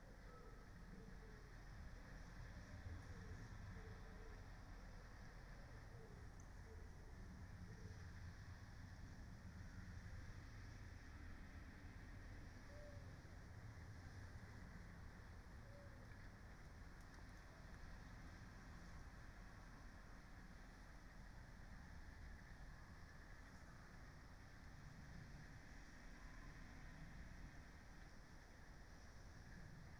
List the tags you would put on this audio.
Soundscapes > Nature
soundscape natural-soundscape Dendrophone alice-holt-forest modified-soundscape data-to-sound weather-data field-recording phenological-recording artistic-intervention nature raspberry-pi sound-installation

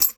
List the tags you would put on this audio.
Sound effects > Other
cash change coins game glint interface jingle loose metallic money ring small ui

